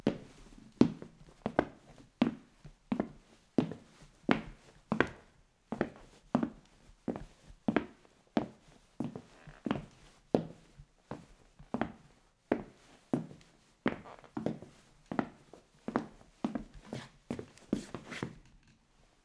Sound effects > Human sounds and actions
footsteps, walking, hardwood01
footsteps, hardwood, walk, foley, walking